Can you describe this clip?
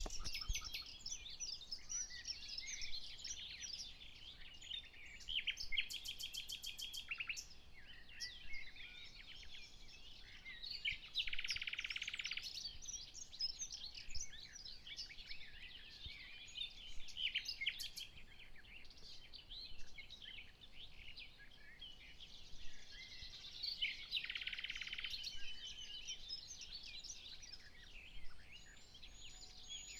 Soundscapes > Nature
AMBIANCE oiseaux - birds soundscape
AMBIANCE d'oiseaux bavards - many birds quiet in countryside, Zoom H4 XY
bird, pitched